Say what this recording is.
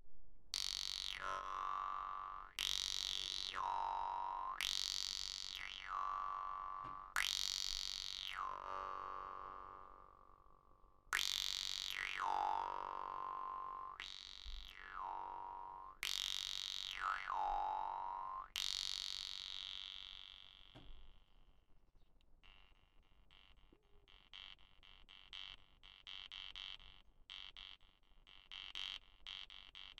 Music > Solo instrument
Vargan solo was recorded on Pixel 6pro